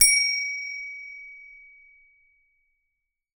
Instrument samples > Percussion
Bell 4.5x5.5cm 3
Subject : A bell 4.5cm wide, 5.5cm tall. Date YMD : 2025 04 21 Location : Gergueil France. Hardware : Tascam FR-AV2 Rode NT5 microphone. Weather : Processing : Trimmed and Normalized in Audacity. Probably some Fade in/outs too.
FR-AV2, oneshot, close-up, ding, Rode, one-shot, bell, NT5, table-bell